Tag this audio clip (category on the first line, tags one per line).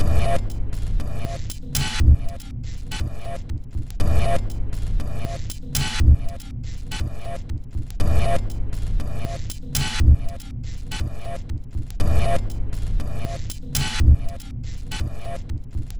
Instrument samples > Percussion
Weird
Dark
Soundtrack
Ambient
Industrial
Samples
Packs
Drum
Loopable
Loop
Underground
Alien